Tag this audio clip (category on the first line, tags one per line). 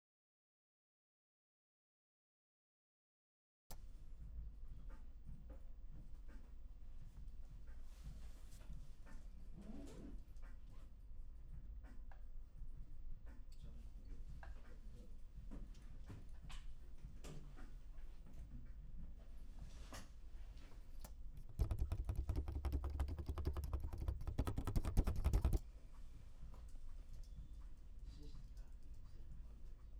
Soundscapes > Indoors
class
college
school